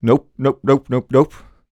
Speech > Solo speech
Fear - Nope nope NOPE
Video-game, Tascam, Single-take, nope, U67, NPC, Voice-acting, Human, fear, oneshot, FR-AV2, refusal, Male, Neumann, voice, talk, Vocal, word, Mid-20s, dialogue, Man, singletake